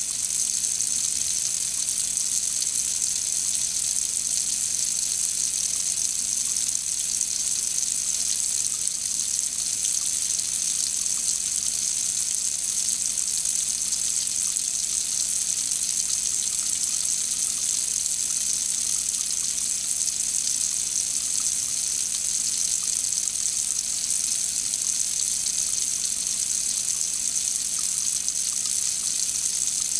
Sound effects > Other
Kitchen Sink 01
A Rode M5 microphone was placed 18 inches away from a kitchen sink faucet while running water. Those sounds were captured by a Zoom H4N multitrack recorder. I then normalized the audio using Audacity. And finally uploaded the output file for others to use as they see fit.
drain, faucet, kitchen, low-pressure, rode-m5, running, sink, water, zoom-h4n